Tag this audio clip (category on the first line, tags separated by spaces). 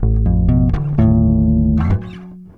Instrument samples > String
bass electric fx loop loops mellow oneshots plucked riffs